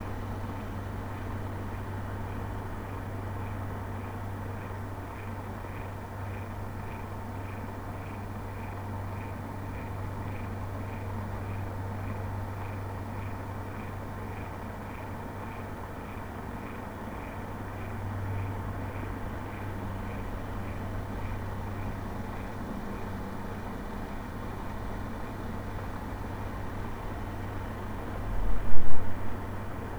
Sound effects > Objects / House appliances
A fan running, both in low and high speeds
air, air-conditioning, ac, appliance